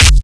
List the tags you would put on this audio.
Instrument samples > Percussion
Brazilian
Distorted

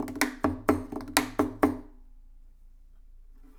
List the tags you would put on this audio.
Music > Solo instrument
dissonant
riff
pretty
twang
string
acosutic
strings
slap
guitar
solo
chord
instrument
chords
knock

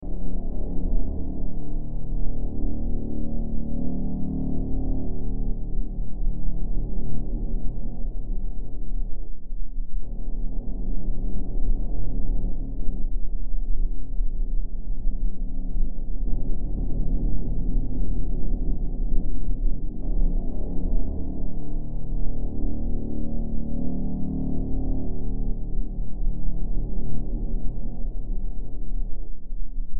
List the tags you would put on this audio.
Soundscapes > Synthetic / Artificial
Ambience
Ambient
Darkness
Drone
Games
Gothic
Hill
Horror
Noise
Sci-fi
Silent
Soundtrack
Survival
Underground
Weird

